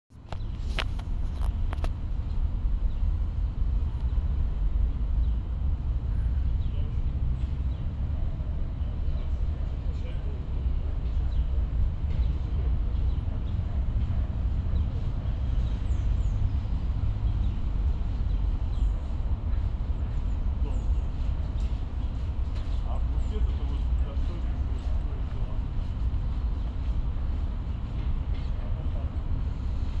Sound effects > Natural elements and explosions
atmospheric sounds of the park, cars driving in the background, birds singing, people talking, foliage rustling , the park in the summer
vehicles, people, background-sound, birds, park